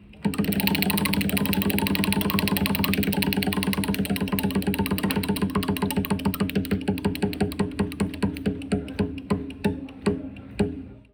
Sound effects > Other mechanisms, engines, machines
Wheel of fortune HZA
Actual wheel of fortune spinning and slowing down. Stereo recording using iPhone 16.
spinning, rattle, wheel-of-fortune